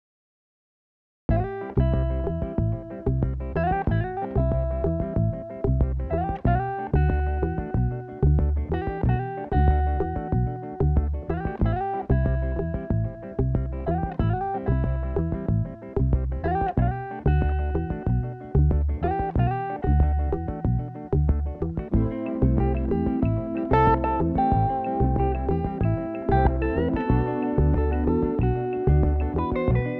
Music > Multiple instruments
cool funky night time bossa nova guitar vibe
A cool groovy bossa nova inspired chord sequence with multiple instruments including bass, simple drums, percussion and multiple electric guitars. Gear Used: Abelton Line 6 Helix Meris Enzo synth tc electronic flashback delay